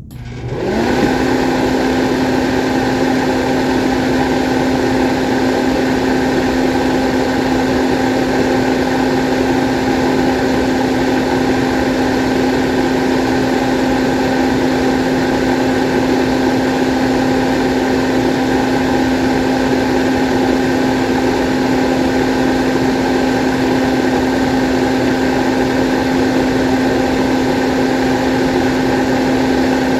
Sound effects > Other mechanisms, engines, machines
COMAv-Samsung Galaxy Smartphone, CU Seerite 6X6 Opaque Projector, Start, Run, Stop Nicholas Judy TDC
A Seerite 6X6 Opaque projector turning on, running and turning off.
projector, stop, seerite-6x6-opaque, start, turn-on, turn-off, Phone-recording, run